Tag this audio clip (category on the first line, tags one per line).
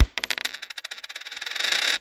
Sound effects > Objects / House appliances
foley
drop
Phone-recording
spin
penny